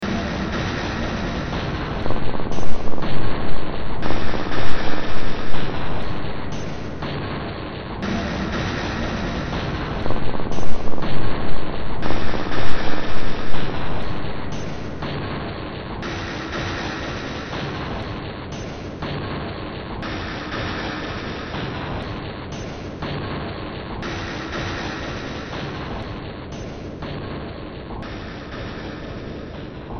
Music > Multiple instruments
Demo Track #3325 (Industraumatic)
Cyberpunk, Industrial, Underground, Soundtrack, Horror, Noise, Sci-fi, Ambient, Games